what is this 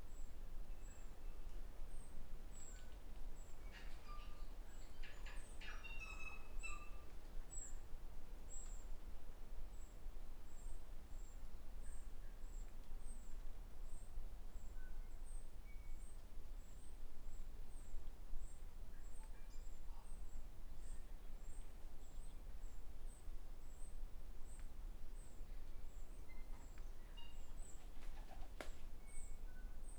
Soundscapes > Nature
AMBForst Tui and redpoll calling in the bush and wind, Oreti Beach, New Zealand
Recorded 18:01 01/01/26 A somewhat windy day by the Kilmock bush track. Throughout the recording is mainly a tui, redpoll calling, also a bellbird and what I think is a dunnock. A bit of light wind in the trees as well. Zoom H5 recorder, track length cut otherwise unedited.
Daytime, Field-Recording, New, Oreti, Redpoll, Trees, Tui, Windy